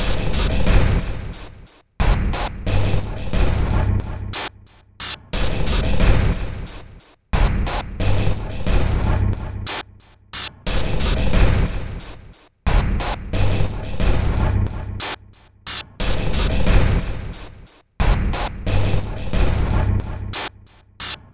Instrument samples > Percussion
This 90bpm Drum Loop is good for composing Industrial/Electronic/Ambient songs or using as soundtrack to a sci-fi/suspense/horror indie game or short film.
Soundtrack, Weird, Drum, Industrial, Packs, Dark, Underground, Alien, Ambient, Samples, Loop, Loopable